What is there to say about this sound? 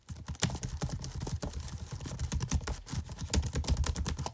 Sound effects > Electronic / Design
My own custom typing sound effect made by pressing random keys on my laptop's keyboard.
keyboard, type, typing, computer, laptop